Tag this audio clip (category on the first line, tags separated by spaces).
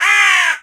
Sound effects > Animals
close crow-caw